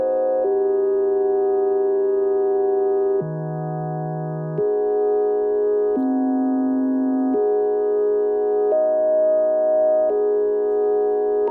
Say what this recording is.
Soundscapes > Synthetic / Artificial
April 4th 21 o'clock
Night forest sonification from April 4th, 2025 (00:00), with pitch shaped by air temperature and CO₂, rhythm from sunlight, vibrato from radiation, and tonal color from wind and humidity.